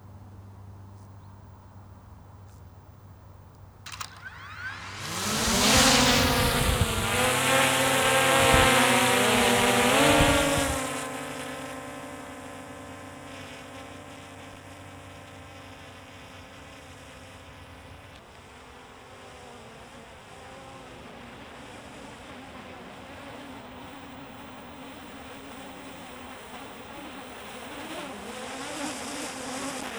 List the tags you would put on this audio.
Sound effects > Other mechanisms, engines, machines
drone soundscape everyday

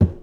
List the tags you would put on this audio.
Sound effects > Objects / House appliances
drop foley carry pail kitchen plastic clatter spill scoop tool fill object lid

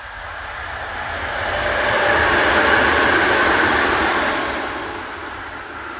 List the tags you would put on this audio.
Soundscapes > Urban
Drive-by field-recording Tram

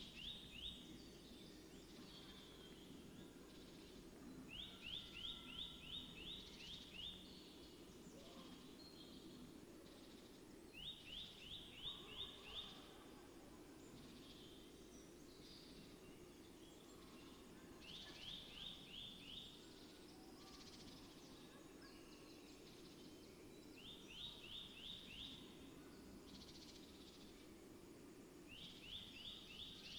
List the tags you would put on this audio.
Soundscapes > Nature
alice-holt-forest artistic-intervention Dendrophone field-recording modified-soundscape natural-soundscape nature phenological-recording raspberry-pi sound-installation

